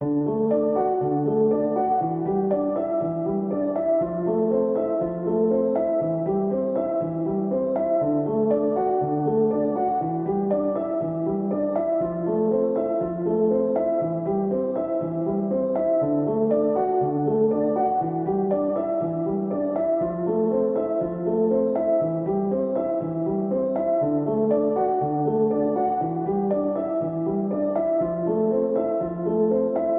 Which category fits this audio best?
Music > Solo instrument